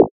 Instrument samples > Synths / Electronic
BWOW 8 Ab
additive-synthesis, fm-synthesis, bass